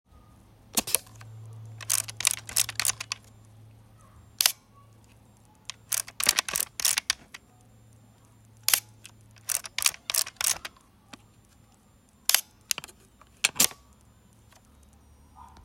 Sound effects > Other mechanisms, engines, machines
Olympus XA shutter click. Recorded with iPad voice recorder app